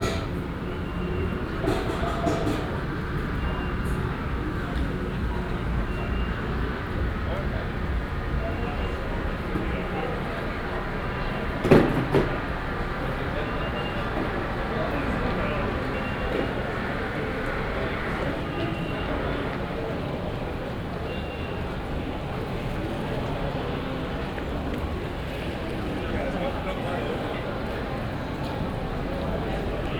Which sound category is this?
Soundscapes > Urban